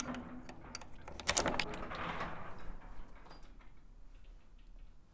Sound effects > Objects / House appliances
bay door jostle metal-006
clunk, foley, natural, fieldrecording, perc, foundobject, oneshot, bonk, metal, stab, glass, drill, percussion, sfx, industrial, object, hit, fx, mechanical